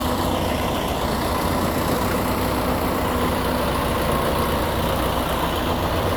Soundscapes > Urban
Bus stationary at bus stop
Bus stationary at the bus stop: revving engine. Recorded with Samsung galaxy A33 voice recorder. The sound is not processed. Recorded on clear afternoon winter in the Tampere, Finland.